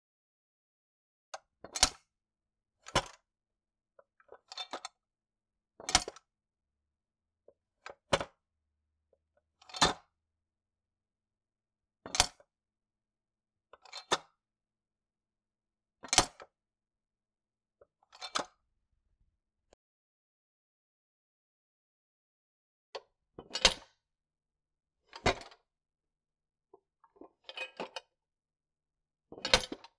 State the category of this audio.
Sound effects > Objects / House appliances